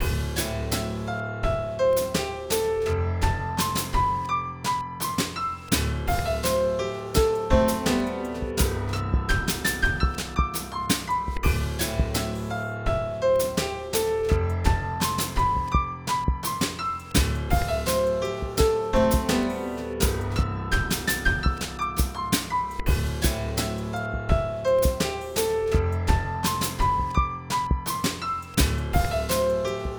Music > Multiple instruments
a chill and thoughtful piano melody with a jazz break beat, slower and groovy